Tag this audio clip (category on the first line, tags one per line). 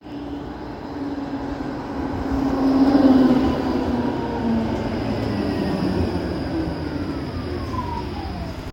Sound effects > Vehicles
field-recording
Tampere